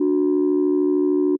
Instrument samples > Synths / Electronic
Landline Phonelike Synth D5
Landline-Holding-Tone, Landline, just-minor-3rd, Tone-Plus-386c, Holding-Tone, Old-School-Telephone, just-minor-third, Landline-Phonelike-Synth, JI, JI-Third, JI-3rd, Synth, Landline-Phone, Landline-Telephone-like-Sound, Landline-Telephone